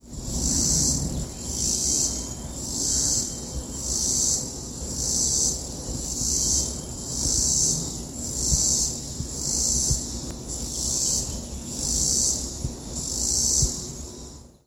Sound effects > Animals
ANMLInsc-Samsung Galaxy Smartphone, CU Cicada, Song, Loud Nicholas Judy TDC
A loud cicada song.